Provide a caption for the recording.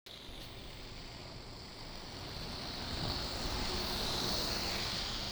Sound effects > Vehicles
tampere bus15
bus, vehicle